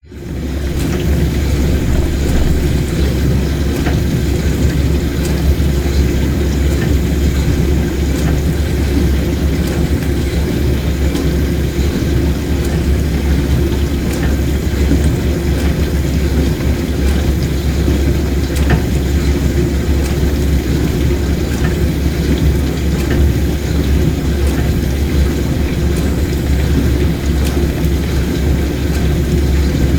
Sound effects > Objects / House appliances
A sound of dishwashed running from a distant distance. Recorded with Zoom H6 and SGH-6 Shotgun mic capsule.